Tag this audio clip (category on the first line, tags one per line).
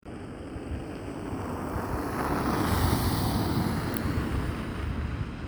Sound effects > Vehicles
car
engine
vehicle